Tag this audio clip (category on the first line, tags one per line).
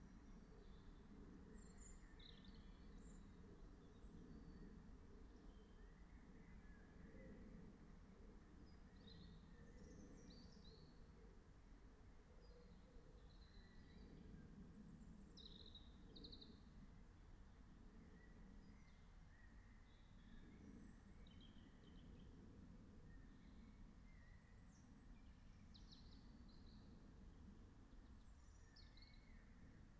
Soundscapes > Nature
field-recording
natural-soundscape
meadow
soundscape
alice-holt-forest
nature
phenological-recording
raspberry-pi